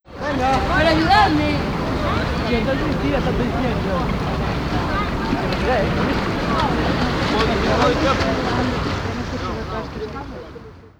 Soundscapes > Urban
071 PEDROINESBRIDGE TOURISTS WATER-PLAY 3
speaking, female, male, water, voices